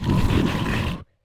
Sound effects > Other
combo wind ice
27 - Combined Wind and Ice Spells Sounds foleyed with a H6 Zoom Recorder, edited in ProTools together